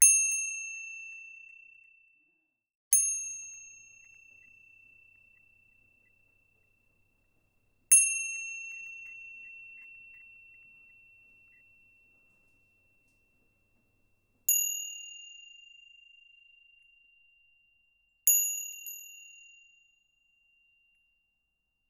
Sound effects > Objects / House appliances
A small bell that is struck and left to ring
bell, chime, ding